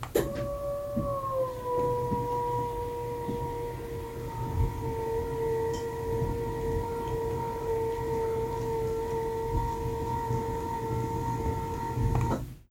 Sound effects > Objects / House appliances
MOTRSrvo-Samsung Galaxy Smartphone, CU Servo Motor, Bed, Raise Nicholas Judy TDC
A bed servo motor raising.